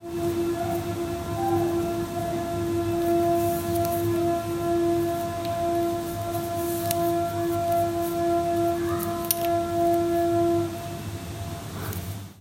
Soundscapes > Urban
Splott - Distant Horn - Wilson Street
A distant train horn, recorded from a garden on a Zoom H4N.
fieldrecording, wales